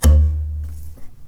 Sound effects > Other mechanisms, engines, machines
Woodshop Foley-038
sound, bam, wood, knock, rustle, foley, tools, bop, thud, shop, tink, crackle, bang, oneshot, strike, pop, percussion, sfx, metal, boom, perc, fx, little